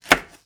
Sound effects > Other
Hard chop vegetable 6
Potato being cut with a santoku knife in a small kitchen.